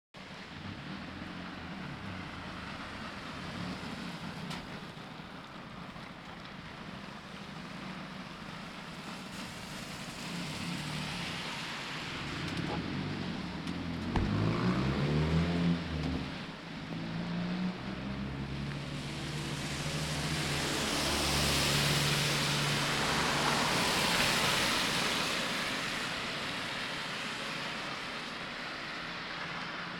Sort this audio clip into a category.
Sound effects > Other